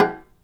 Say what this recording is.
Sound effects > Other mechanisms, engines, machines
Handsaw Oneshot Hit Stab Metal Foley 23
plank, sfx, metallic, hit, smack, percussion, twangy, metal, vibration, vibe, fx, handsaw, foley, twang, shop, household, saw, perc, tool